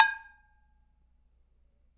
Sound effects > Objects / House appliances
perc percussion foley oneshot glass mechanical drill sfx fx stab hit bonk clunk metal natural fieldrecording foundobject object industrial
perc, clunk, percussion, glass, industrial, foley, sfx, fx, metal, natural, drill, oneshot, object, bonk, hit, foundobject, stab, mechanical, fieldrecording